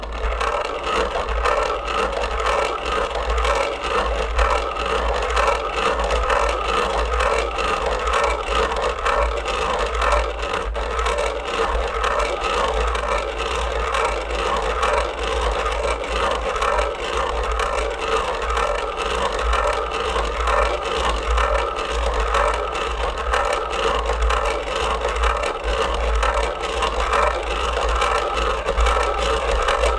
Sound effects > Other mechanisms, engines, machines
MACHAntq-Blue Snowball Microphone, CU Coffee Grinder, Grinding, X3 Nicholas Judy TDC
An antique coffee grinder grinding. Three takes.
Blue-Snowball, antique, Blue-brand, foley, grind, coffee-grinder